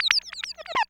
Sound effects > Experimental
Analog Bass, Sweeps, and FX-202
analog, alien, retro, korg, electronic, effect, trippy, electro, analogue, fx, vintage, robot, synth, sweep, pad, robotic, dark, oneshot, sample, sfx, bassy, scifi, weird, complex, snythesizer, sci-fi, mechanical, basses, machine, bass